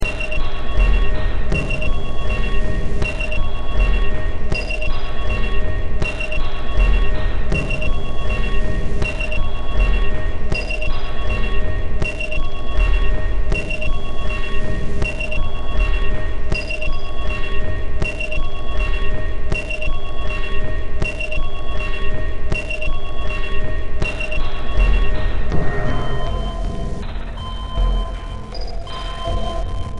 Music > Multiple instruments
Demo Track #3565 (Industraumatic)
Cyberpunk, Games, Horror, Soundtrack, Industrial, Ambient, Noise, Underground, Sci-fi